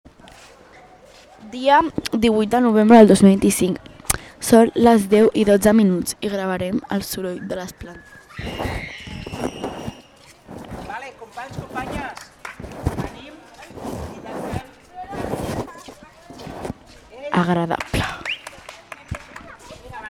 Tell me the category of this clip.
Soundscapes > Urban